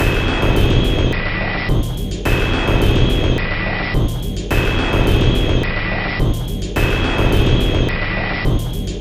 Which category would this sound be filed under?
Instrument samples > Percussion